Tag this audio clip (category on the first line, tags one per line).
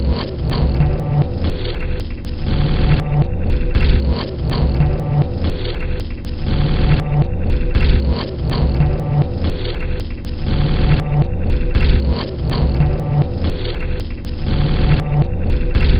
Instrument samples > Percussion
Alien
Ambient
Dark
Drum
Industrial
Loop
Loopable
Packs
Samples
Soundtrack
Underground
Weird